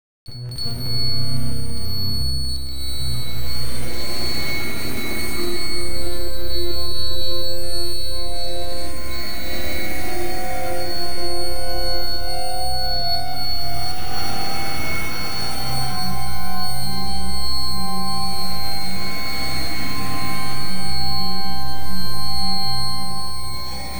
Soundscapes > Synthetic / Artificial
Trickle Down The Grain 2
granulator, soundscapes, free, sample, noise, samples, sfx, experimental, electronic, glitch, sound-effects, packs